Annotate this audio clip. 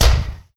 Sound effects > Natural elements and explosions
Explosion 2 (Burning Car rec by Ñado)
Explosion from a burning car.
bang, boom, car, destroy, destruction, explode, exploding, explosion, fire, flame, flames, ka-pow, loud, night, realistic, vehicle